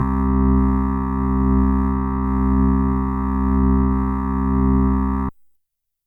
Instrument samples > Synths / Electronic
Synth organ patch created on a Kawai GMega synthesizer. C4 (MIDI 60)
digital, gmega, kawai, organ, strange, synthesizer